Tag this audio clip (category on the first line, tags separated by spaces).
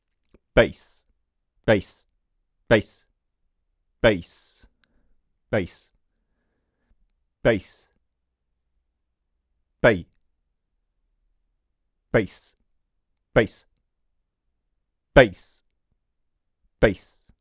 Speech > Solo speech
chant FR-AV2 hype Male Man Mid-20s multi-take Neumann Tascam to-be-edited U67 Vocal voice